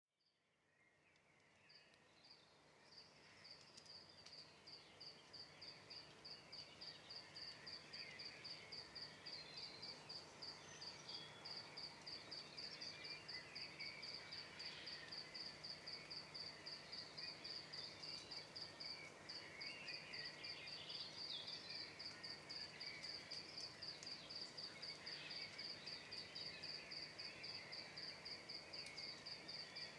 Soundscapes > Nature
Late June Suburban Soundscape
Time period represented here is approximately 03:50 to 05:40 on 26th June, 2025. Sunrise was at 04:42. A cricket (I don't know the species, but will amend this description if I am able to determine this) can be heard from the start as well as distant birds. The first birds to be heard close by are Eurasian magpies. Others that can be heard include common wood pigeon, carrion crow, common blackbird, dunnock, house sparrow and great spotted woodpecker. The microphones were fixed to stems of a Buddleia close to an oak tree in a residential area that can be considered suburban. Recorded with a Zoom F3 and Earsight Standard Stereo Pair of microphones.